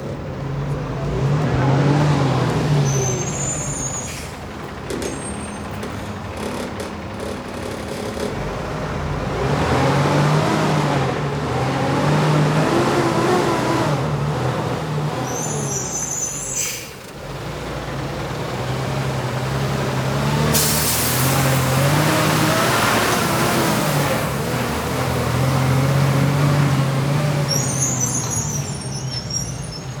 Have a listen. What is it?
Sound effects > Vehicles
Camion recolector de basura en la noche, grabado en barrio arguello. Use MKH416 y SoundDevices mixpre3 ----------------------------------------------------------------------------------------------------------------------- Garbage truck at night, passing through the Argüello neighborhood (cordoba, argentina). I used the MKH416 and SoundDevices Mixpre3.